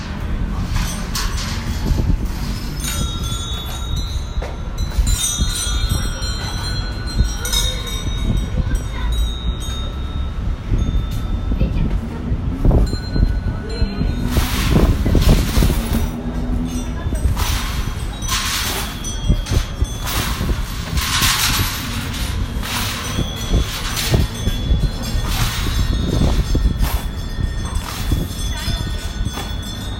Soundscapes > Urban
Bells at Golden Buddha Temple, Bangkok, Thailand (Feb 22, 2019)
Ambient recording of bells at the Golden Buddha Temple in Bangkok. Resonant tones and subtle surrounding temple ambiance.
ambient, Bangkok, bells, Buddha, Golden, metallic, object, ringing, spiritual, temple, Thailand